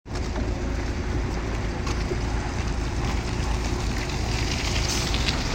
Soundscapes > Urban
Bus door closing 1 9
Where: Pirkkala What: Sound of a bus door closing Where: At a bus stop in the morning in a calm weather Method: Iphone 15 pro max voice recorder Purpose: Binary classification of sounds in an audio clip
bus, bus-stop, field-recording